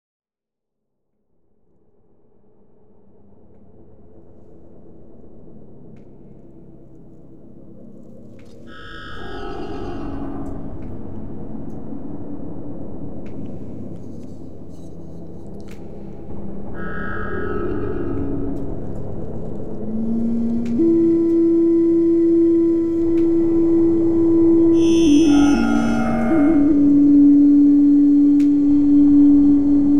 Music > Multiple instruments
Polvo en el tiempo 3
Pieza realizada para el documental "Polvo en el tiempo". Sistema Michoacano de Radio y Televisión. Piece created for the documentary "Dust in Time." Michoacan Radio and Television System.